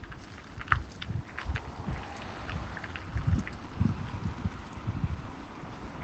Sound effects > Vehicles
slow moving car wintertyres near and passing cars distant
Slow-moving car with studded tyres driving to a crossing of a busy road with traffic. Recorded near a busy urban road on a parking lot in near-zero temperature, using the default device microphone of a Samsung Galaxy S20+.
traffic, slow, car, studded-tyres